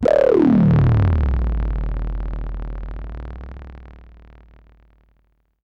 Sound effects > Experimental
Analog Bass, Sweeps, and FX-107
pad, robot, oneshot, sci-fi, machine, vintage, snythesizer, analog, robotic, korg, mechanical, scifi, trippy, fx, alien, complex, dark, synth, effect, bass, retro, sfx, basses, electro, electronic, analogue, sample, weird, sweep, bassy